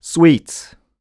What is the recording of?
Speech > Solo speech
Joyful - Sweet 4
dialogue, FR-AV2, happy, Human, joy, joyful, Male, Man, Mid-20s, Neumann, NPC, oneshot, singletake, Single-take, sweet, talk, Tascam, U67, Video-game, Vocal, voice, Voice-acting, word